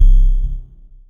Instrument samples > Synths / Electronic
CVLT BASS 113
bass, bassdrop, clear, drops, lfo, low, lowend, stabs, sub, subbass, subs, subwoofer, synth, synthbass, wavetable, wobble